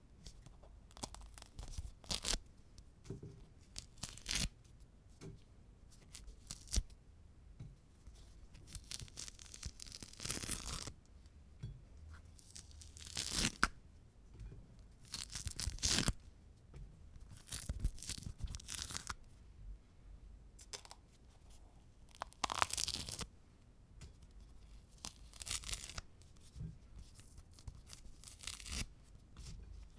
Sound effects > Objects / House appliances
Peeling a tangerine. Suggestion of use: tearing flesh, bones crushing. Recorded with Zoom H2.
fruit, tangerine, peeling